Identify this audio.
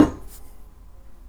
Sound effects > Other mechanisms, engines, machines
metal shop foley -037
bop, crackle, fx, little, metal, oneshot, percussion, sfx, sound, strike, thud, wood